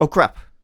Speech > Solo speech
Surprised - Oh crap 2
dialogue, FR-AV2, Human, Male, Man, Mid-20s, Neumann, NPC, oneshot, singletake, Single-take, surprised, talk, Tascam, U67, Video-game, Vocal, voice, Voice-acting